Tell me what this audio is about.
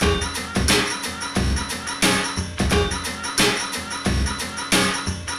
Music > Solo percussion
A short set of Acoustic Breakbeats recorded and processed on old tape. All at 89 BPM